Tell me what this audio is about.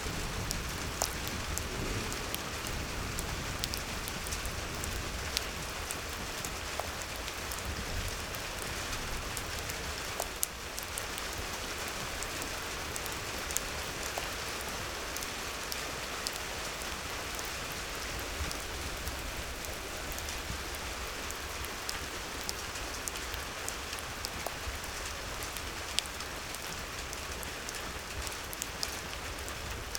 Sound effects > Natural elements and explosions
RAIN steady rain with dripping water on coy mat
Steady rain falling onto a metal roof with water dripping from overflowing gutters onto a coy mat